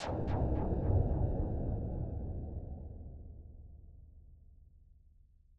Sound effects > Electronic / Design
LOW PROFOUND HIT
BASSY, BOOM, DEEP, DIFFERENT, EXPERIMENTAL, EXPLOSION, HIPHOP, HIT, IMPACT, INNOVATIVE, LOW, RAP, RATTLING, RUMBLING, TRAP, UNIQUE